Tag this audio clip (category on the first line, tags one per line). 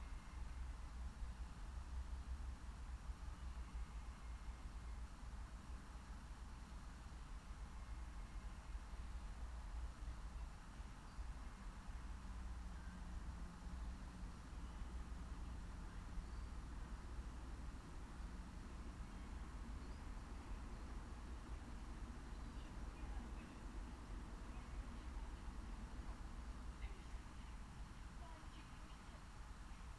Soundscapes > Nature
soundscape
raspberry-pi